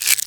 Sound effects > Objects / House appliances
Pill Bottle Shake 5
Pill bottle sounds
bottle, close, closing, drug, drugs, med, meds, open, opening, pill, Pills, shaking